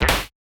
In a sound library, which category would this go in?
Sound effects > Electronic / Design